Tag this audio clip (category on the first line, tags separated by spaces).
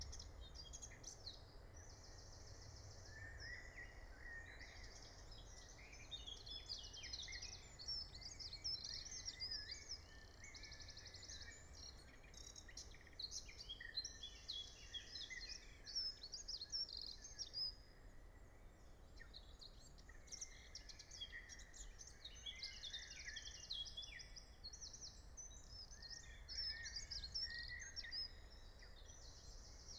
Soundscapes > Nature
alice-holt-forest nature phenological-recording raspberry-pi